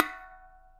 Sound effects > Other mechanisms, engines, machines
shop foley-015
bam, bang, boom, bop, crackle, foley, fx, knock, little, metal, oneshot, perc, percussion, pop, rustle, sfx, shop, sound, strike, thud, tink, tools, wood